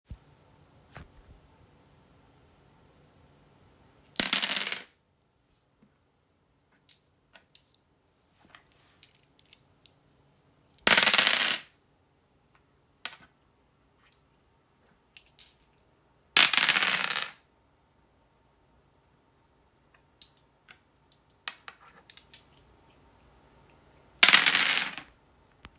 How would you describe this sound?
Sound effects > Objects / House appliances
Rolling 4 dice wooden floor
Rolling 4 dice on wooden floor repeatedly.
Boardgame, Dice, Rolling